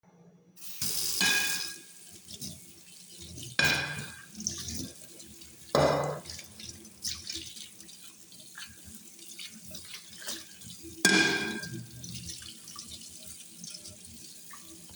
Sound effects > Objects / House appliances
Dishes washing sound
A dishwash operacional sound.